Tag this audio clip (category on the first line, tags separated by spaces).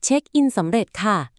Speech > Solo speech
Check-in Checkin Please successful